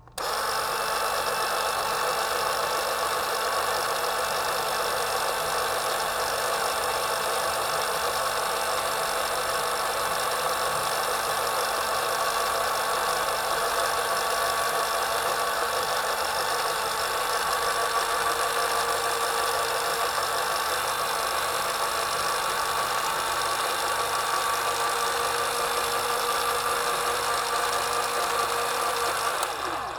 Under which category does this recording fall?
Sound effects > Objects / House appliances